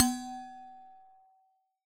Sound effects > Objects / House appliances
Resonant coffee thermos-022
percusive, recording, sampling